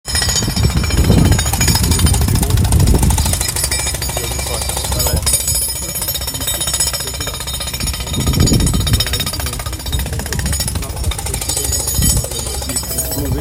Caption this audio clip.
Sound effects > Other mechanisms, engines, machines
Jackhammer noise in Calanques National Park.
jackhammer, noise